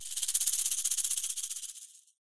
Instrument samples > Percussion
Dual shaker-008
recording, percusive, sampling